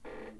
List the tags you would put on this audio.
Sound effects > Objects / House appliances
chair rocking squeek groaning

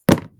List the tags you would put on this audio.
Sound effects > Other
diaryofawimpykid books setdown

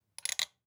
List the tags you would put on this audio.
Sound effects > Other mechanisms, engines, machines
garage crunch sample

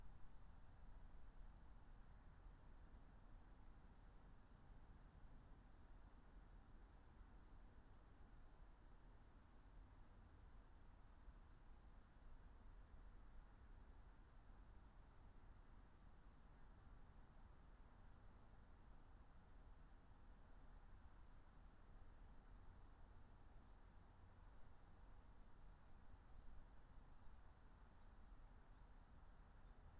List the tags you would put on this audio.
Soundscapes > Nature
meadow soundscape alice-holt-forest nature raspberry-pi natural-soundscape phenological-recording field-recording

